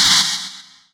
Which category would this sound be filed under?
Instrument samples > Percussion